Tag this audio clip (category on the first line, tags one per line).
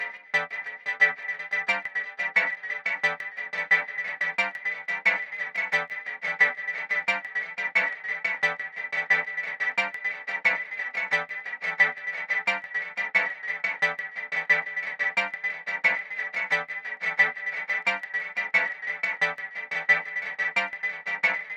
Music > Solo instrument
Bright
delayed
high
pitch
sample
stab